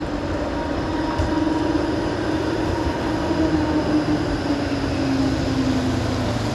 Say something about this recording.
Sound effects > Vehicles
tram-samsung-11
Recording of a tram (Skoda ForCity Smart Artic X34) near a roundabout in Hervanta, Tampere, Finland. Recorded with a Samsung Galaxy S21.
outside, tram, tramway, vehicle